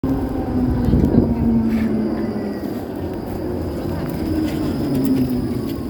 Sound effects > Vehicles
19tram tostopintown
A tram is slowing down towards stopping. Recorded in Tampere with a Samsung phone.
traffic, tram, public-transport